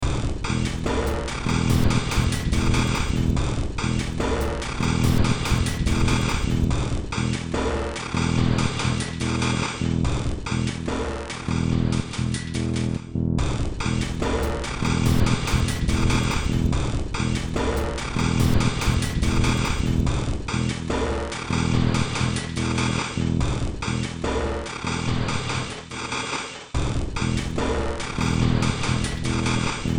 Music > Multiple instruments
Short Track #3246 (Industraumatic)
Ambient, Cyberpunk, Games, Horror, Industrial, Noise, Sci-fi, Soundtrack, Underground